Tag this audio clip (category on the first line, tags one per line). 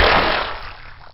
Instrument samples > Percussion
aliendrum
boggy
douse
drain
hydrous
liquid
nature
splatter
squelchy
swampy
water
weird-drum